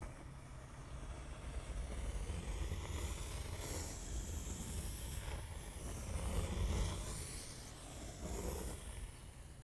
Sound effects > Objects / House appliances
Pencil stroke long
Pencil scribbles/draws/writes/strokes for a long amount of time.